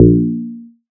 Instrument samples > Synths / Electronic
FATPLUCK 1 Bb
additive-synthesis, bass, fm-synthesis